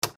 Sound effects > Other mechanisms, engines, machines
Typewriter Key Press 06
Macro & Meso: This is a single, distinct key press from a vintage typewriter. The sound is a sharp, dry "tick" with no discernible background noise. Micro: The audio captures the raw, pure acoustic signature of the typewriter's key action. The sound is unadorned by echo or subtle ambient tones, focusing entirely on the percussive, metallic sound of the key striking the paper platen. Technical & Method: This sound was recorded approximately 2 years ago using an iPhone 14 smartphone in a quiet office room. The audio was processed using Audacity to remove any ambient noise, ensuring a clean and isolated sound. Source & Purpose: The typewriter is a real, classic Brazilian model, similar to a well-known brand such as the Olivetti Lettera 22. The purpose of this recording was to capture and preserve the unique, distinct sound of an iconic mechanical device for use in sound design, Foley, and other creative projects.
office-sound, key-click, keystroke, typewriter, sfx, single-key, typing, writing-machine, manual-typewriter, retro, vintage, analog, old-machine, mechanical, acoustic, key-clack, key-press, sound-effect, foley